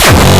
Instrument samples > Percussion
Industrial Hardtechno Kick 2
Stupid sound synthed with phaseplant randomly.